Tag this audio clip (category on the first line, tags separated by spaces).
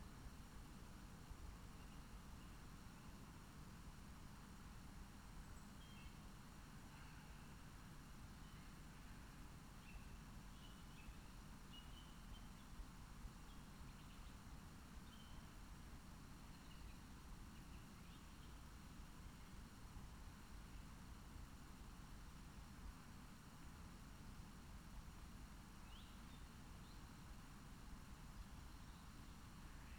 Soundscapes > Nature
phenological-recording meadow alice-holt-forest natural-soundscape nature soundscape raspberry-pi field-recording